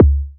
Instrument samples > Percussion
Bigroom-Spring Kick 3
Synthed with bong vst by xoxo from plugin4. Processed with ZL EQ, Khs cliper, Waveshaper, Fruity limiter.